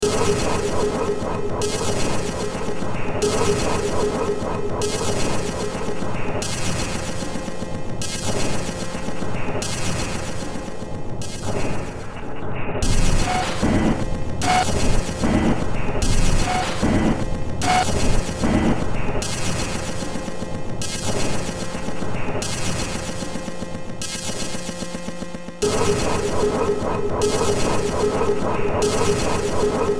Music > Multiple instruments
Track taken from the Industraumatic Project.